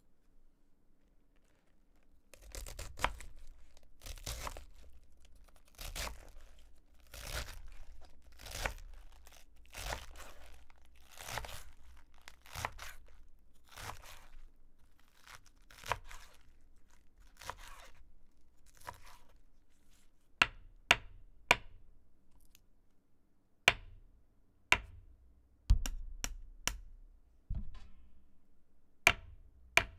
Sound effects > Objects / House appliances
cutting lettuce
Me cutting some romaine heart